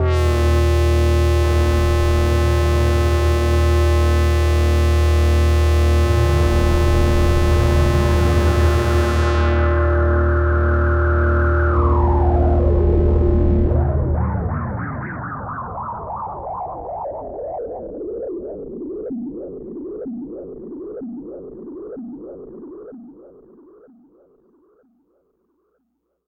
Sound effects > Experimental
Analog Bass, Sweeps, and FX-031
electro, pad, sample